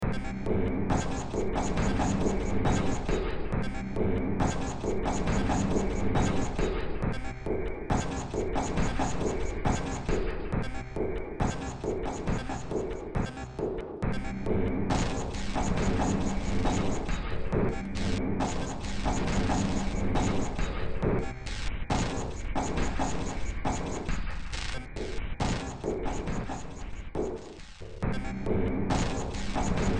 Music > Multiple instruments
Short Track #2981 (Industraumatic)
Ambient, Games, Horror, Industrial, Soundtrack, Underground